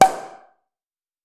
Sound effects > Objects / House appliances
Open or Uncork Champagne Bottle 1
Pop of the uncorking of a champagne bottle.
Champagne, Uncork, Bottle